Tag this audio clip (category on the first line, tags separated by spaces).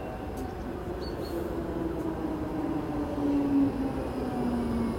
Sound effects > Vehicles
city field-recording Tampere traffic tram